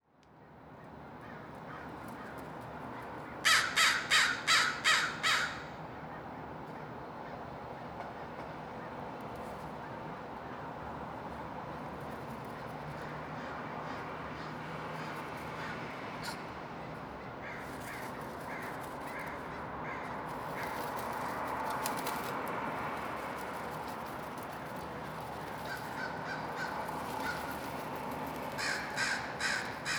Soundscapes > Urban
Every morning I feed a group of crows in my neighborhood. Usually they are more vocal but they don't care for the microphone even though it is hidden inside. This is the crows swooping in and landing on the fire escape where the food is, you can also hear the little tinking when they pick something out of the bowl.
field-recording, caw